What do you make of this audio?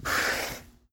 Sound effects > Human sounds and actions
FOLYFeet-Samsung Galaxy Smartphone, CU Skid, Snow 01 Nicholas Judy TDC
Feet skidding in the snow.
feet, foley, skid